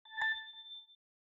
Sound effects > Electronic / Design
"Pick up" UI sound
It was created in Ableton from different layered synth sounds.
positive, success, videojuego, positivo, click, menu, button, glockenspiel, ui, happy, cheerful, pickup, videogame, boton, blessing, interface, app, ux, interfaz, juego, bells, game, metallic